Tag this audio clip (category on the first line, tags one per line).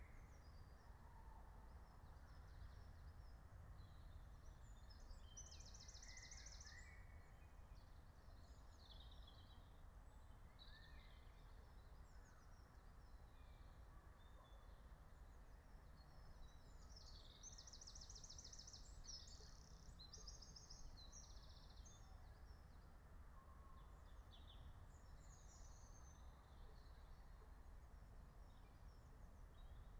Soundscapes > Nature
alice-holt-forest
field-recording
nature
raspberry-pi